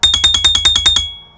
Music > Solo percussion
TOONShake-Blue Snowball Microphone, CU Xylophone, Toy, Head Nicholas Judy TDC
A toy xylophone head shake.
cartoon, Blue-Snowball, Blue-brand, shake, toy, head, xylophone